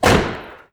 Sound effects > Natural elements and explosions
Explosion 1 (Burning Car rec by Ñado)
Explosion from a burning car.